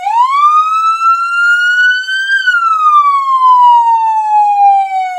Sound effects > Vehicles
SS2000 wail seamless
The wailing siren of a police car, using a Federal Signal SS2000. I specially tuned this recording to make it loop seamlessly, usable for movies, video games, ambiance, etc.
ambulance, car, cop, cruiser, emergency, police, siren